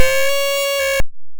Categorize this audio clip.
Sound effects > Electronic / Design